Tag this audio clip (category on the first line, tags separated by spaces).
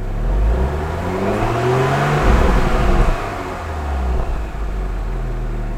Sound effects > Vehicles
automobile car Cayenne engine motor porsche rev revving vehicle